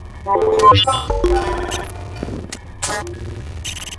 Sound effects > Experimental
This pack focuses on sound samples with synthesis-produced contents that seem to feature "human" voices in the noise. These sounds were arrived at "accidentally" (without any premeditated effort to emulate the human voice). This loop was created with help from Sonora Cinematic's incredible 'Harmonic Bloom' tool, which extracts harmonics from "noisy" source material. This process is another one which often results in chattering or "conversational" babble that approximates the human voice.